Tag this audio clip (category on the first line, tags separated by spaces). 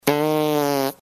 Sound effects > Human sounds and actions
fart gas meteorism health Flatulence farting